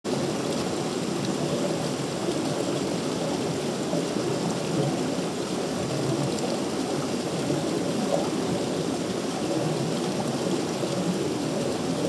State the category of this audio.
Soundscapes > Nature